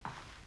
Sound effects > Human sounds and actions
Footstep Leaves
A single footstep on a hard of a soft crunchy surface, could be carpet or leaves.
footstep, step, shoe